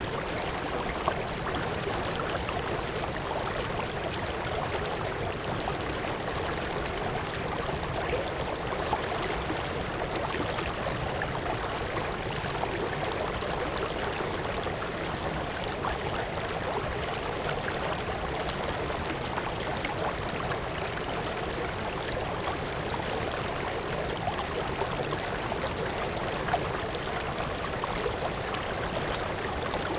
Soundscapes > Nature
Gentle stream before waterfall
Gentle stream picking up speed with the crash of the falls in the left channel. ORTF, Line Audio CM4's.
waterfall, river, stream, field-recording, water